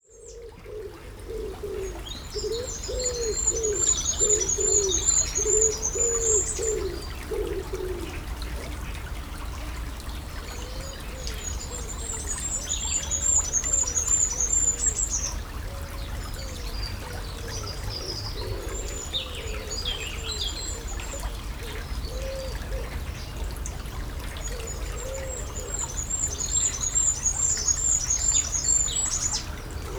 Soundscapes > Nature
A recording of water flowing through a stream in a residential area.
ambience, car, Field, flowing, recording, residential, stream, traffic, water